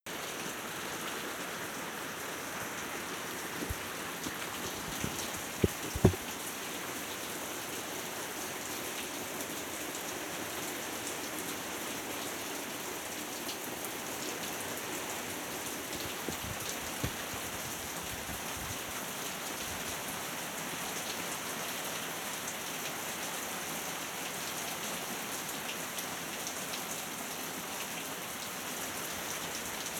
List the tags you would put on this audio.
Soundscapes > Nature
ambiance ambience field-recording nature pour rain shower water weather

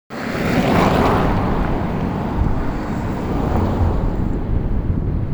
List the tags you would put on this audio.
Sound effects > Vehicles
car traffic

